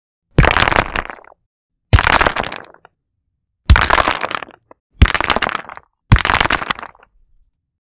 Sound effects > Natural elements and explosions
rock impact and debris fall 01062026
sounds of rock hits and rubble fall sounds. some rock falls were layered and one of them is pitched down to make them bigger.
elemental, movement, hit, debris, gravel, avalanche, falling, fall, rocks, rock, impact, earth, stone, dirt, hits, rubble, pebbles, drop, stones